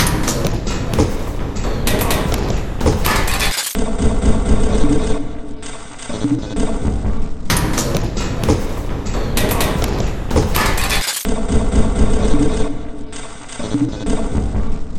Instrument samples > Percussion
This 128bpm Drum Loop is good for composing Industrial/Electronic/Ambient songs or using as soundtrack to a sci-fi/suspense/horror indie game or short film.
Loopable, Soundtrack, Packs, Underground, Weird, Ambient, Samples, Dark, Industrial, Drum, Loop, Alien